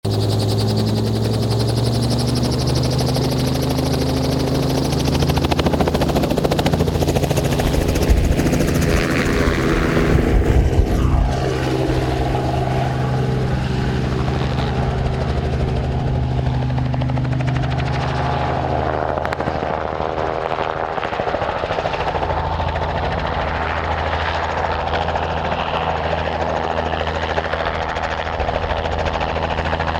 Sound effects > Vehicles
Robinson R44 helicopter take off
Robinson R44 ( I think ) take off at Bonamazi wildlife reserve in KZN South Africa, a rhino de-horning mission.